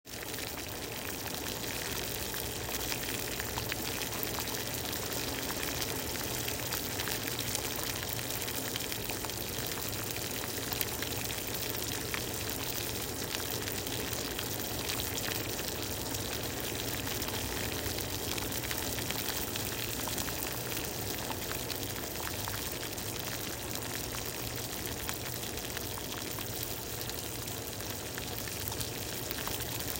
Sound effects > Objects / House appliances
Boiling pot of water on kitchen stove.
boil, boiling, cook, cooker, cooking, food, hot, kitchen, pot, stove, water